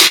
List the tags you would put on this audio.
Instrument samples > Percussion
Avedis
Avedis-Zildjian
bang
China
clang
clash
crack
crash
crunch
cymbal
hi-hat
Istanbul
low-pitchedmetal
Meinl
metallic
multi-China
multicrash
Paiste
polycrash
Sabian
shimmer
sinocrash
sinocymbal
smash
Soultone
Stagg
Zildjian
Zultan

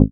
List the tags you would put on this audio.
Instrument samples > Synths / Electronic
fm-synthesis
additive-synthesis
bass

